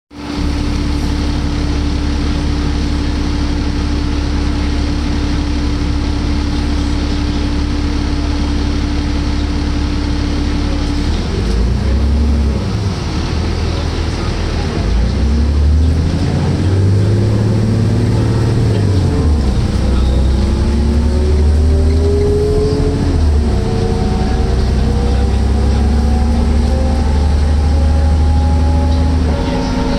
Sound effects > Vehicles
2005 New Flyer D40LF Transit Bus #2 (MiWay 0558)
I recorded the engine and transmission sounds when riding the Mississauga Transit/MiWay buses. This is a recording of a 2005 New Flyer D40LF transit bus, equipped with a Cummins ISL I6 diesel engine and Voith D864.3E 4-speed automatic transmission. This bus was retired from service in 2023.
2005, bus, cummins, d40, d40lf, d40lfr, driving, engine, flyer, isl, mississauga, miway, new, public, ride, transit, transmission, transportation, truck, voith